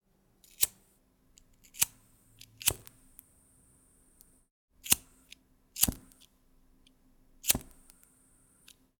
Sound effects > Objects / House appliances
Fire, Lighter, Flint, Flicking, Sparking
Recorded with a Tascam DR-05X. Thank you!
Flint, Gas, Fire, Flicking, Light, Lighter, Sparking